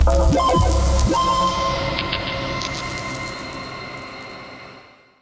Instrument samples > Synths / Electronic
CVLT BASS 4
bass, bassdrop, clear, drops, lfo, low, lowend, stabs, sub, subbass, subs, subwoofer, synth, synthbass, wavetable, wobble